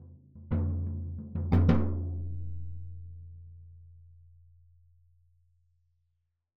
Music > Solo percussion
floor tom-Fill - 16 by 16 inch
tomdrum, tom, toms, velocity, beatloop, beat, drumkit, percussion, oneshot, instrument, percs, fill, beats, studio, roll, flam, floortom, acoustic, perc, kit, drums, rimshot, rim, drum